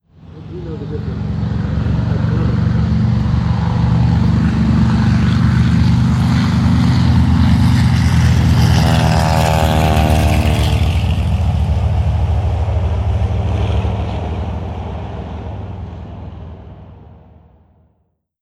Sound effects > Vehicles
AEROMil-CU Avenger Plane, Pass By Nicholas Judy TDC
An Avenger plane passing by. Recorded at the Military Aviation Museum at Virginia Beach in Summer 2021.
avenger, fly-by, military, pass-by, Phone-recording, plane